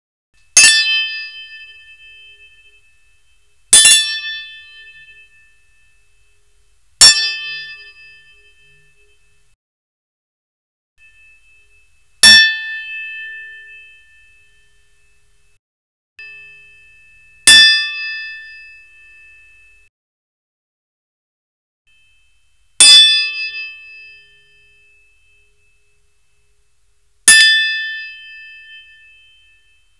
Sound effects > Objects / House appliances
Tanjiro or hf sword fencing sounds silverware ringouts only FINAL 11242025
custom sword fencing like rattly hits sounds inspired by heavens feel and demon slayer. utilizing metal silverware spoons and forks with two spoons tied or tapped to a string.
anime, attack, battle, blade, combat, demon-slayer, duel, epee, fate, feel, fencing, fight, fighting, heavens, hit, kimetsu, martialarts, medieval, melee, metal, metallic, no, ring, ringout, silverware, sword, weapons, yaiba